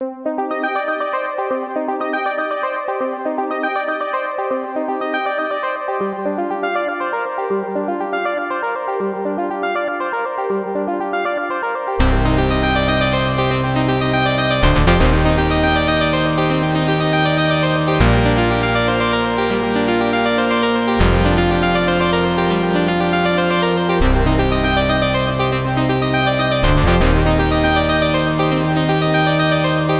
Music > Multiple instruments
Main Menu 2 music
just music for my personal game, ai generated by Udio Beta (v1.5 allegro), prompted "futuristic music for a fighting game, use synthesizers and drum kits"
ai-generated, futuristic, game, synth